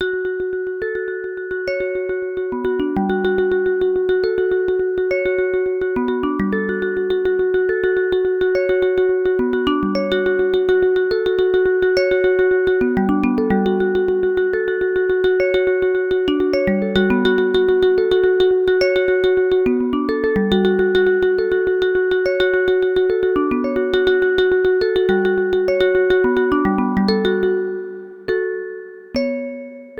Music > Solo instrument
Factory patch C1 on Nord Drum 3p, a vibraphone or glockenspiel type pitched percussion sound with reverb. Picking out a melody in a triplet rhythm at 140 bpm, then playing some diads.